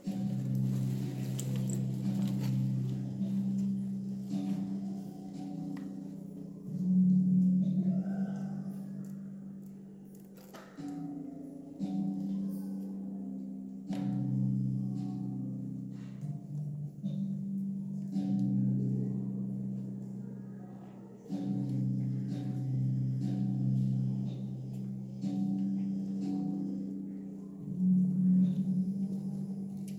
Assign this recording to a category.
Music > Solo instrument